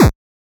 Instrument samples > Percussion
8 bit-Noise Kick3
8-bit, FX, game, percussion